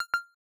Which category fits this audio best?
Sound effects > Electronic / Design